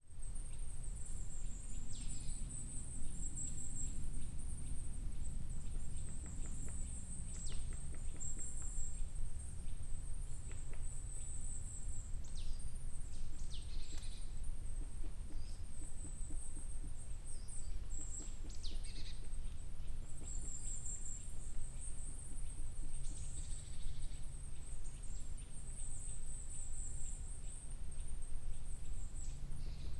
Soundscapes > Nature
Autumn Forest Ambiance in the Odenwald
This field-recording was made in the Odenwald, near the village of Hesselbach. Various birdsong can be heard, as well as the sound of a woodpecker at work. The sound of pines falling from the trees can be made out, as well as the wind. A plane passes overhead. The recording was made on 12 October 2025 at 13:12 using a Zoom H5.